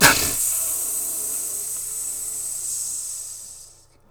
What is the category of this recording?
Sound effects > Animals